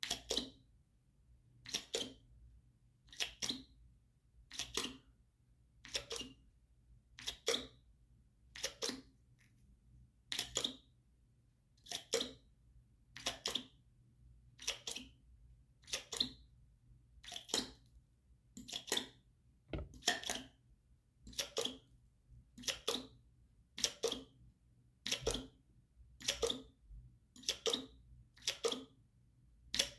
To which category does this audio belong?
Sound effects > Objects / House appliances